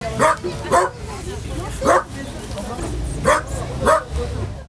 Sound effects > Animals
There was an angry dog barking in the street, voices can be heard in the background